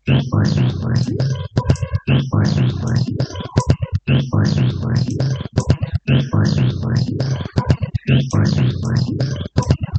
Sound effects > Electronic / Design
This is a dark pad and ambient pack suitable for sci-fi, horror, mystery and dark techno content. The original 20 samples were made with Waldorf PPG Wave 2.2 vst, modeled after the hardware synth. They include both very high and very low pitches so it is recommended to sculpt out their EQ to your liking. The 'Murky Drowning' samples are versions of the original samples slowed down to 50 BPM and treated with extra reverb, glitch and lower pitch shifting. The, 'Roil Down The Drain' samples are barely recognizable distorted versions of the original samples treated with a valve filter and Devious Machines Infiltrator effects processor. The, 'Stirring The Rhythms' samples were made by loading up all the previous samples into Glitchmachines Cataract sampler.